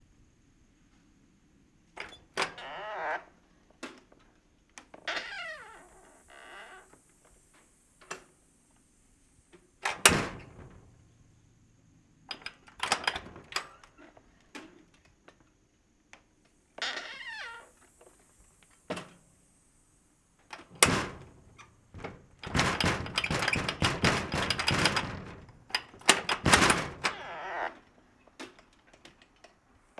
Sound effects > Objects / House appliances
Creaking glass door opening and closing, Door being rattled aggressively. Recorded with a ZOOM H6 and a Sennheiser MKE 600 Shotgun Microphone. Go Create!!!